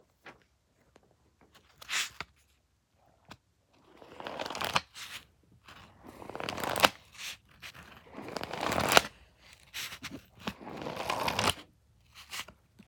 Sound effects > Objects / House appliances
Flipping through dictionary pages
Me flipping through pages of a dictionary
Dictionary, Flipping